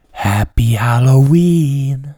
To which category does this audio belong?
Speech > Solo speech